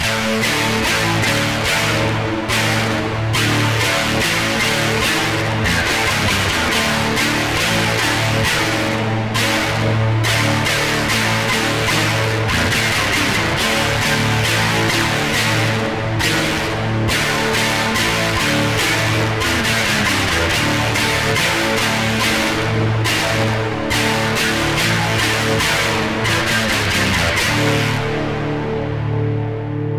Music > Solo instrument
Dramatic life
Just rough. Neve edited. One out of hundreds riffs... I try in this one to express drama. Use a Fender Strato and Amplitube 5.